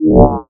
Instrument samples > Synths / Electronic
DISINTEGRATE 2 Eb
bass, additive-synthesis